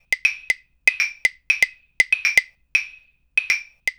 Music > Solo percussion
Three Claves-8
eight loops made from samples of three claves in interesting polyrhythms. Can be used alone or in any combination (they all should sound fine looped together in virtually any order)